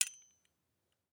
Sound effects > Other mechanisms, engines, machines
Glass Hit 01
hit, sample, glass